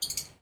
Sound effects > Animals
Excited Birb Sound
Making assumptions about a caged bird, recorded Jan 28, 2025 at the Affandi Museum in Yogyakarta (aka Yogya, Jogjakarta, Jogja) using a Moto G34, cleaned up in RX and Audacity.
single, little-bird, birdie, isolated, chirping, bird-chirp, bird, short, calling, birb, indonesia, bird-chirping, call, chirp